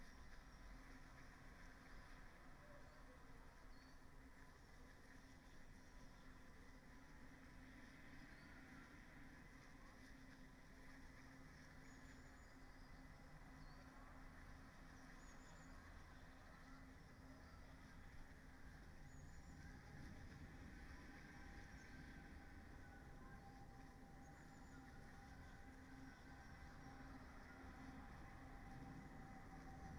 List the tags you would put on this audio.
Soundscapes > Nature
sound-installation
raspberry-pi
data-to-sound
nature
soundscape
artistic-intervention
natural-soundscape
weather-data
field-recording
modified-soundscape
alice-holt-forest
phenological-recording
Dendrophone

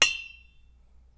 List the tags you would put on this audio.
Sound effects > Objects / House appliances
bonk
clunk
drill
foley
foundobject
hit
industrial
natural
object
oneshot

